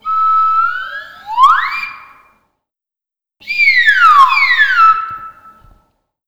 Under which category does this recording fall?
Sound effects > Objects / House appliances